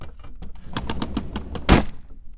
Sound effects > Objects / House appliances
ice tray pull out1
ice, pull, tray